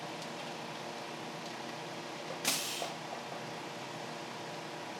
Sound effects > Vehicles
VEHCnst Street Construction Cement Mixer Truck Air Brake Usi Pro AB RambleRecordings 002
This is the sound of a cement mixer after parking to pour cement for street repair. This was recorded in downtown Kansas City, Missouri in early September around 13:00h. This was recorded on a Sony PCM A-10. My mics are a pair of Uši Pros, mounted on a stereo bar in an AB configuration on a small tripod. The mics were placed in an open window facing the street where some road construction was happening. The audio was lightly processed in Logic Pro, The weather was in the low 20s celsius, dry, and clear.